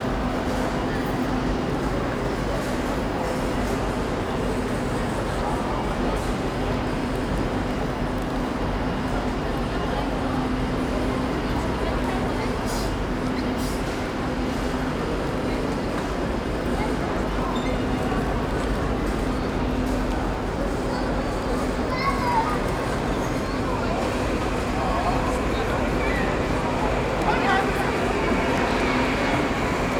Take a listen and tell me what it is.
Soundscapes > Urban
Busy Railway Station - London
Busy railway station main area. Recorded in a national rail station in London. Featuring voices, ambient engines, slight wind, beeps from TFL card readers, people walking by.
London, field-recording, station, people, city, train, ambience, busy